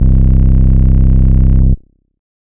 Instrument samples > Synths / Electronic
VSTi Elektrostudio (Davosynth+Model Mini+Micromoon)
vst, vsti, bass, synth